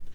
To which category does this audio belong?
Sound effects > Objects / House appliances